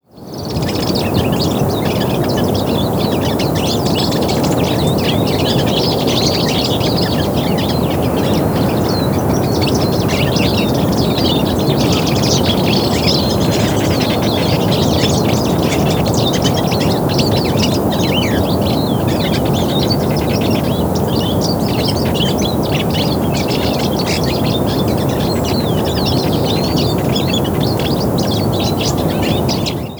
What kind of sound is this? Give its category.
Soundscapes > Nature